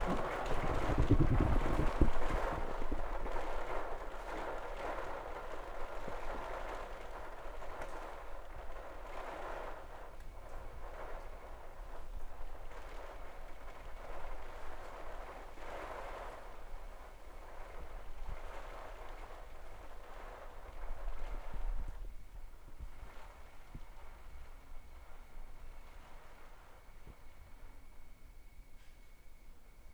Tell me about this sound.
Soundscapes > Indoors
Rain falling on a skylight
Short recording of rain falling down on my skylight.